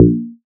Instrument samples > Synths / Electronic
FATPLUCK 2 Bb
additive-synthesis, bass, fm-synthesis